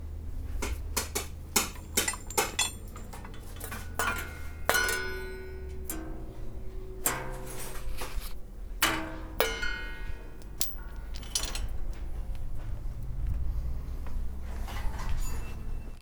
Sound effects > Objects / House appliances
Junkyard Foley and FX Percs (Metal, Clanks, Scrapes, Bangs, Scrap, and Machines) 189
Percussion, Clang, Environment, Robot, Bang, dumpster, SFX, rubbish, Junk, waste, Foley, Dump, trash, dumping, FX, Bash